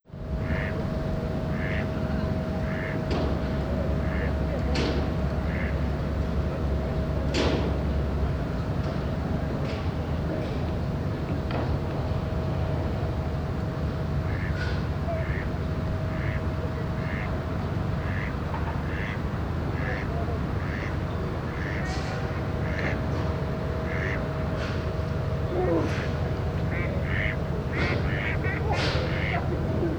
Soundscapes > Urban
052 DMBPARK CONSTRUCTION-NOISES DUCKS PIGEONS
birds, construction, pigeons